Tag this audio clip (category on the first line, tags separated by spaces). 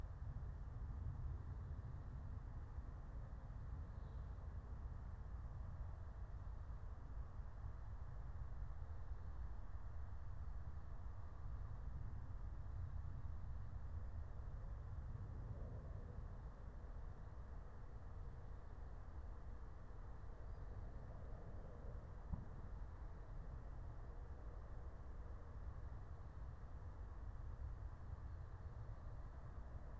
Soundscapes > Nature
raspberry-pi phenological-recording nature alice-holt-forest meadow soundscape field-recording natural-soundscape